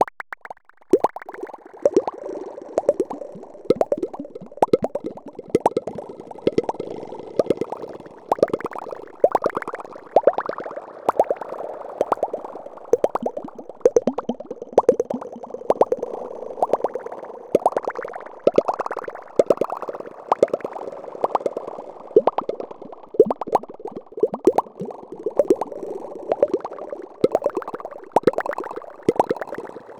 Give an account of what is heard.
Soundscapes > Synthetic / Artificial
Blip blop reverb and echoes #003
blip, blop, echo, ipad, reverb